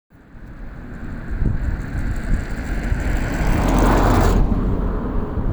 Sound effects > Vehicles
Outdoor recording of a passing car on Malminkaari Road in Helsinki. Captured with a OnePlus 8 Pro using the built‑in microphone.